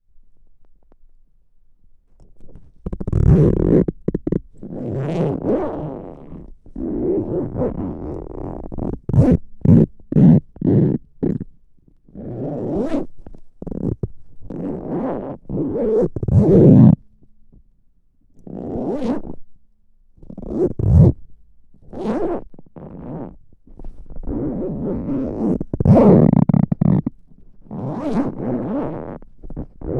Sound effects > Objects / House appliances
OBJZipr-Contact Mic Zipper of cello case SoAM Sound of Solid and Gaseous Pt 1 Objects
There is one sound of my first test field recording Library "Sound of Solid and Gaseous Pt.1" with Zoom H4e and Contact mic by IO Audio. Wish it will be useful! Record_by_Sound_of_Any_Motion SoAM